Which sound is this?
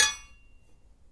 Sound effects > Other mechanisms, engines, machines
metal shop foley -020
bam, bang, boom, bop, crackle, foley, fx, knock, little, metal, oneshot, perc, percussion, pop, rustle, sfx, shop, sound, strike, thud, tink, tools, wood